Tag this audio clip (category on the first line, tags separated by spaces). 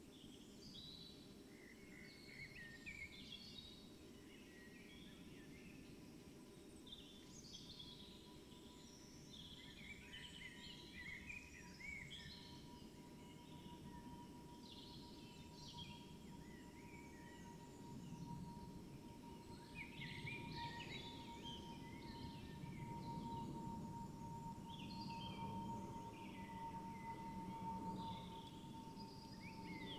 Soundscapes > Nature
modified-soundscape soundscape field-recording artistic-intervention nature weather-data data-to-sound sound-installation Dendrophone raspberry-pi alice-holt-forest natural-soundscape phenological-recording